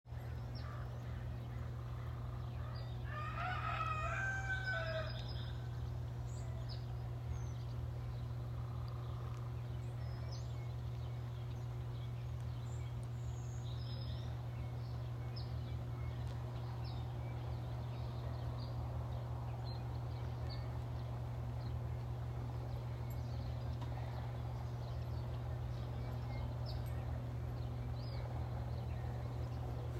Soundscapes > Nature
Rich morning, soundscape 04/14/2023
farm, morning, ambience